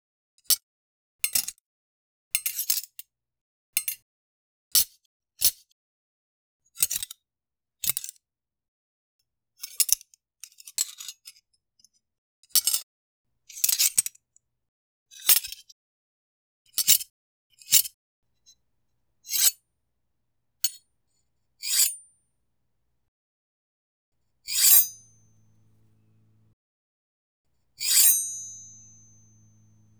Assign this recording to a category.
Sound effects > Objects / House appliances